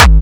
Instrument samples > Percussion
OldFiles-Classic Crispy Kick 1-G#
Synthed with phaseplant only, just layered 2 noise generator and a 808 kick that synthed with sine wave, then overdrived them all in a same lane. Processed with Khs Distrotion, Khs Filter, Khs Cliper. Final Processed with ZL EQ, OTT, Waveshaper.
brazilianfunk, Crispy, Distorted, Kick, powerful, powerkick